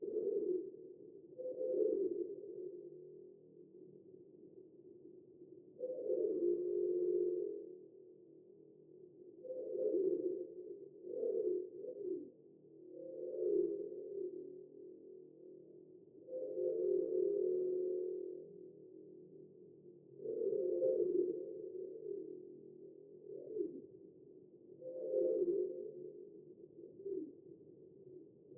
Sound effects > Electronic / Design
manipulated Spectral stretch cylons
Sound of sparrows slowed down to 25% put through SpecOps VST effect by Unfiltered Audio, using the slow-down knob. At more extreme settings, this slow-down effect introduces a typical metallic sound. Reminds me of the Cylon's sound in the original Battlestar Galactica series form the 80s.
alien, cylon, FX, robot, robotic, sci-fi, sound-design